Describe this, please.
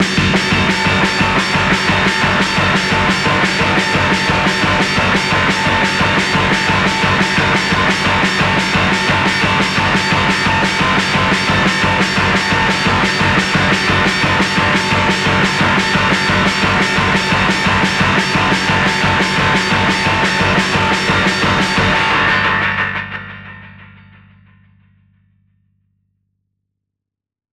Instrument samples > Percussion
Simple Bass Drum and Snare Pattern with Weirdness Added 004
FX-Drum-Pattern, FX-Laden-Simple-Drum-Pattern, Bass-and-Snare, Fun, FX-Laden, Noisy, Bass-Drum, Simple-Drum-Pattern, Four-Over-Four-Pattern, Interesting-Results, Snare-Drum, Silly, FX-Drum, Experiments-on-Drum-Patterns, Experiments-on-Drum-Beats, Experimental-Production, Experimental, FX-Drums, Glitchy